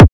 Instrument samples > Percussion
BrazilFunk Kick 22
BrazilFunk, BrazilianFunk, Distorted, Kick